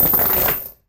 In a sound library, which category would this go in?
Instrument samples > Percussion